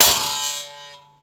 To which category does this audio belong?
Sound effects > Objects / House appliances